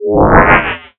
Instrument samples > Synths / Electronic
DISINTEGRATE 1 Ab
bass, fm-synthesis